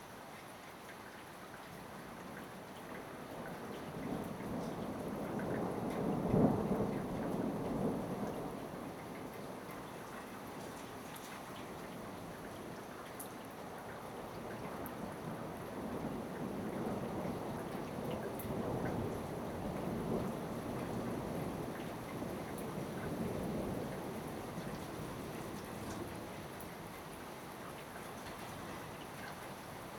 Soundscapes > Nature
Christmas Eve Thunderstorm
A truly magnificent thunderstorm descended upon our town on Christmas Eve last year; here is a small fragment of it.
field-recording, rain, thunderstorm